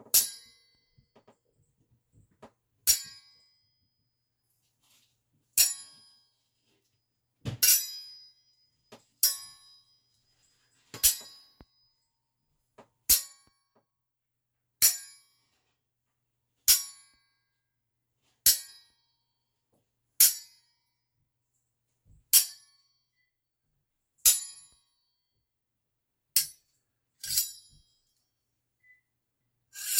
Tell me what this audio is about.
Sound effects > Objects / House appliances
WEAPSwrd-Samsung Galaxy Smartphone Sword, Hits, Scrapes, Shings Nicholas Judy TDC
Sword hits, scrapes and shings.